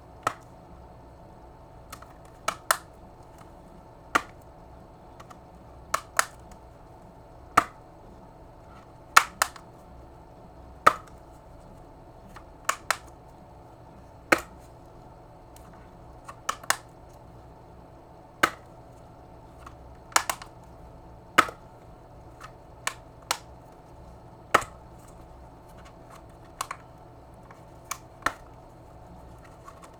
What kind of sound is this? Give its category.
Sound effects > Objects / House appliances